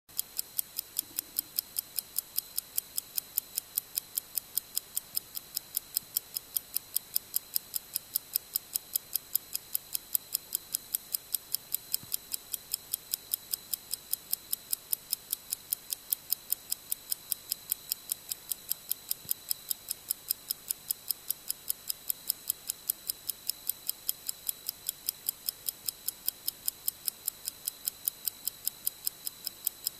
Sound effects > Experimental
Mechanical clock ticking for one minute.